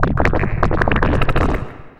Instrument samples > Synths / Electronic
Benjolon 1 shot34
1SHOT, CHIRP, NOISE, SYNTH